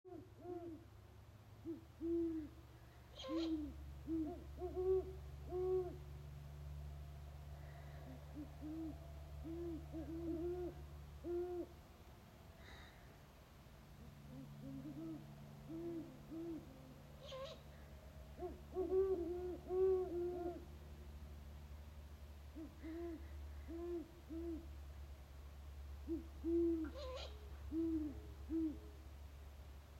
Soundscapes > Nature
Owls strong multiple 08/04/2023
Sound of owls next door
great-horn-owls, owls, birds, night